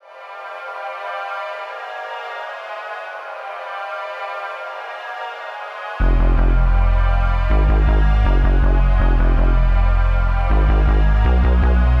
Music > Other
fragment 4 - 160 BPM
Fragment of an unfinished song with the drums removed. Use for whatever you feel like.
fragment, synthesizer